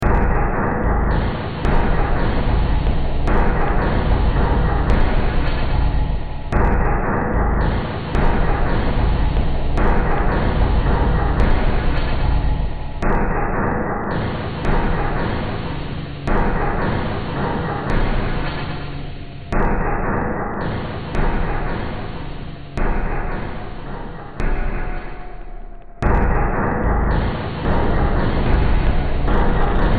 Music > Multiple instruments
Demo Track #3757 (Industraumatic)
Noise, Sci-fi, Underground, Games, Industrial, Horror, Soundtrack, Cyberpunk, Ambient